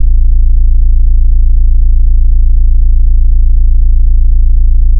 Sound effects > Electronic / Design
Mildly distorted 56 Hz - 28 Hz sineblend for soundbuilding (drums, atmospheres, etc.). High-cut the sineblend for a smoother sound.
sinemix, deep, ultrabass, bassbase, foundation, sinewaves, bassthrob, fundamental, 56-Hz, Lissajous, kicksine, basshum, sinewave, low, low-end, Fourier, 28-Hz, harmonics, Hz, soundbuilding, electronic, subspectral, basspulse, sinusoid, hum, 56Hz, bass, megabass, superbass, fundamentals